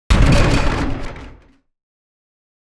Sound effects > Other
Sound Design Elements Impact SFX PS 072
percussive, crash, hit, transient, game, force, smash, collision, rumble, blunt, strike, shockwave, audio, effects, sharp, thudbang, hard, heavy, explosion, impact, power, sfx, cinematic, design, sound